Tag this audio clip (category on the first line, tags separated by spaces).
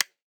Sound effects > Human sounds and actions
interface activation toggle button click off switch